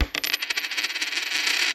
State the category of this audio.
Sound effects > Objects / House appliances